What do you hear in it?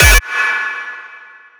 Instrument samples > Percussion
PVC Kick 2-TOC
Used Grvkicks and a Chromo Kick from FLstudio original sample pack. I used Plasma to boost 200-400 HZ to make it sounds digital and plastical. Cunchy Bass with a Grvkick added waveshaper and Fruity Limiter. And rumble used ZL EQ, Fruity Reeverb 2 and added waveshaper and Fruity Limiter too. The reversed gate noise parallelly conneted with dry sounds of its TOC, and just used Fruity Reeverb 2 and ZL EQ to make it screeching. Well altough it sounds bad, but I think can use it standard stage of hardstyle producing.